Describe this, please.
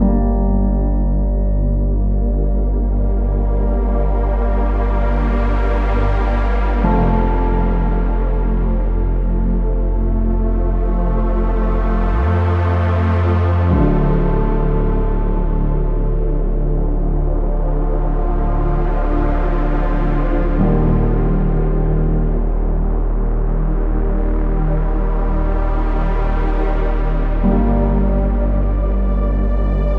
Music > Multiple instruments
deep blue chords loop Gmin 140
add your melodic touches to this lush chord progression created with Arturia Analog Collection and Serum in G minor. 140 bpm. made sure this one loops up nicely. enjoy.
ambient, film